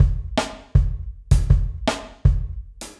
Music > Solo percussion
Drum Beat @ 80bpm
80bpm, Beat, ChordPlayer, Drums, music, OneMotion, Simple